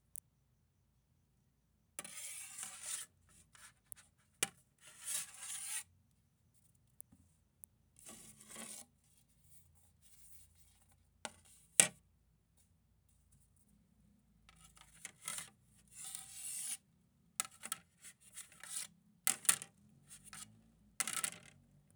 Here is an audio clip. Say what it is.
Sound effects > Objects / House appliances
PLASTICMISC plastic plates rubbing sliding each other NMRV FSC2
Bamboo dishes sliding each other rubbing dry echo sound plastic
Plates, Bamboo